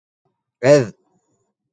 Sound effects > Other
ztha-sisme
male, voice, vocal, arabic